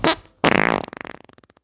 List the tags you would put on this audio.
Sound effects > Other
flatulence
gas

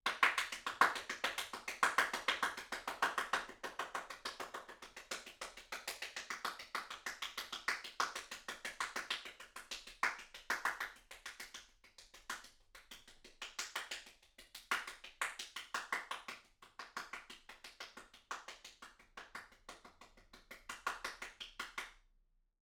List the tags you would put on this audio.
Sound effects > Human sounds and actions
Applaud
Applauding
Applause
AV2
clap
clapping
FR-AV2
individual
indoor
NT5
person
Rode
solo
Solo-crowd
Tascam
XY

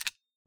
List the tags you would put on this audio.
Sound effects > Other mechanisms, engines, machines
percusive
recording
sampling
shaker

